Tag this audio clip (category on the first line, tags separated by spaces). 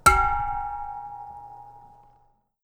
Sound effects > Objects / House appliances
Blue-Snowball dull quiet